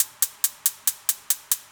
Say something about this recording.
Sound effects > Other mechanisms, engines, machines
Recorded from a blackstone grill. Grill has been equalized.